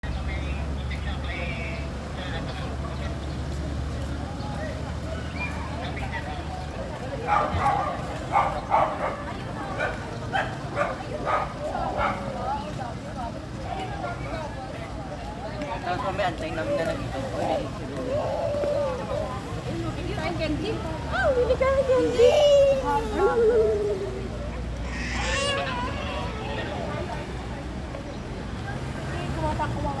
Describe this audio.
Soundscapes > Urban
Camp John Hay - General Ambience
This audio recording is included in the Camp John Hay Sounds Collection for the General Ambience Series pack. This recording, which immerses listeners in an authentic and richly textured soundscape, was done at Camp John Hay, a historic, pine-forested former U.S. Military Base in Baguio, Philippines, which has now been converted into a popular mixed-use tourist destination. The recording was made with a cellphone and it caught all the elements of nature and humans present at the site, such as the gentle rustling of pine needles swaying with the wind, birds calling from afar, visitors walking on gravel pathways, conversations being discreetly carried out, leaves flapping from time to time, and the quiet atmosphere of a high-up forested environment. These recordings create a very lifelike atmosphere that is very wide in usage from teaching to artistic work, and even in the background to just relaxing.
ambience, atmospheric, audio, background, cinematic, design, destination, environment, environmental, forest, game, location, mixed-use, mobile, natural, nature, pine, quiet, recording, scenic, site, sound, sounds, tourist, trees